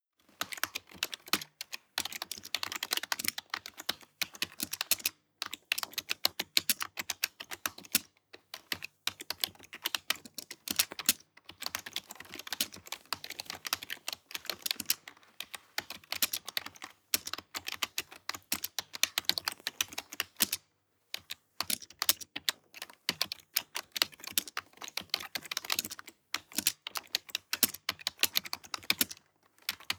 Sound effects > Objects / House appliances
CMPTKey Cinematis RandomFoleyVol5 Peripherals Keyboard Generic Typing Normal
Steady, rhythmic typing with clear mechanical clicks. This is one of the several freebies from my Random Foley | Vol.5 | Peripherals | Freebie pack.
clicks, foley, keyboard, keystrokes, mechanical, neutral, peripheral, rhythmic, steady, typing